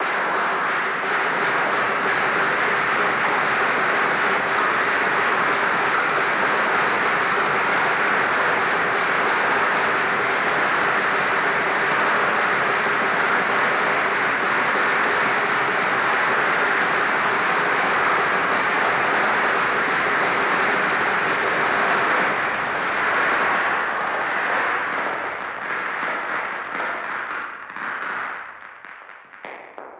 Music > Solo percussion
Simple Bass Drum and Snare Pattern with Weirdness Added 017
Bass-and-Snare, Bass-Drum, Experimental, Experimental-Production, Experiments-on-Drum-Beats, Experiments-on-Drum-Patterns, Four-Over-Four-Pattern, Fun, FX-Drum, FX-Drum-Pattern, FX-Drums, FX-Laden, FX-Laden-Simple-Drum-Pattern, Glitchy, Interesting-Results, Noisy, Silly, Simple-Drum-Pattern, Snare-Drum